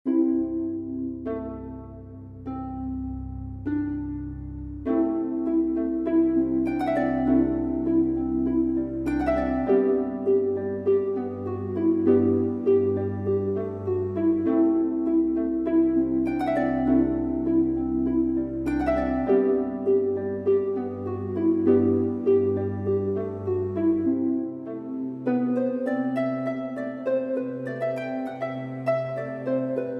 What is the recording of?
Music > Multiple instruments
ambient-music
medieval, rpg, video